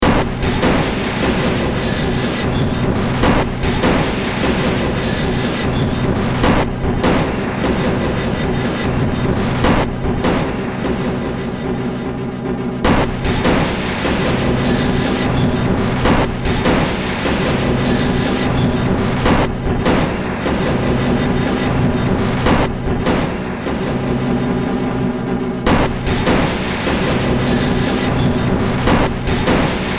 Music > Multiple instruments
Short Track #3022 (Industraumatic)
Games, Horror, Underground, Sci-fi, Noise, Ambient, Industrial, Cyberpunk, Soundtrack